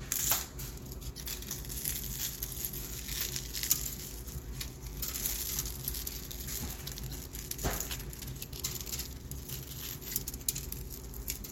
Sound effects > Natural elements and explosions
Tree branches break.